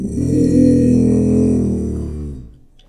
Sound effects > Experimental
Creature Monster Alien Vocal FX-45
evil, Frightening, devil, Ominous, Alien, gamedesign, Snarling, Echo, sfx, demon, Vox, fx, Sounddesign, gutteral, scary, Fantasy, Vocal, Otherworldly, Reverberating, Monster, Growl, visceral, boss, Creature